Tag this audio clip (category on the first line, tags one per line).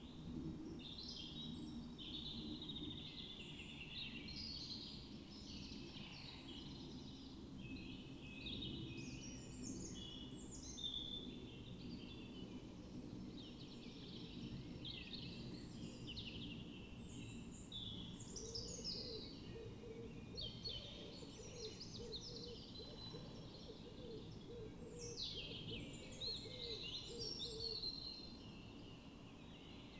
Soundscapes > Nature
natural-soundscape
data-to-sound
raspberry-pi
modified-soundscape
nature
alice-holt-forest
Dendrophone
sound-installation
field-recording
phenological-recording
weather-data
artistic-intervention
soundscape